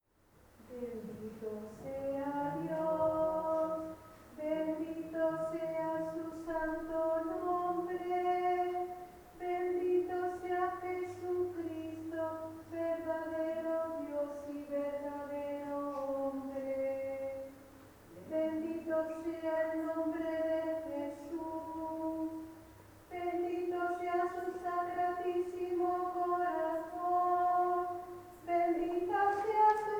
Soundscapes > Other
007A 091116 0435-4 SP Procession at Santuario del Saliente
Procession at Santuario del Saliente. Recorded in June 2025 with a Yamaha pocketrak c24. Fade in/out applied in Audacity. Please note that this audio file has been kindly recorded by Dominique LUCE, who is a photographer.
mass, ambience, women, Nuestra-Senora-del-Saliente, chanting, voice, singing, believers, prayer, song, religion, atmosphere, church, procession, soundscape, lady, Catholic, holy, praying, Spain, field-recording, religious, sanctuary